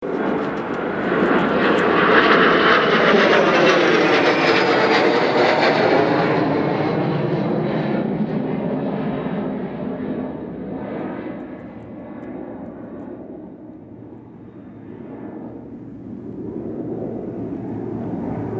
Sound effects > Other mechanisms, engines, machines
BAe 125-800A, Takeoff, from local airport 2.77 miles away. Recorded with SONY IC Recorder. Mod. ICD-UX560F